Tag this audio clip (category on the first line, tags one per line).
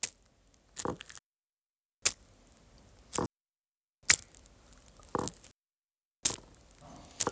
Sound effects > Other
jello
slime
squelch
wet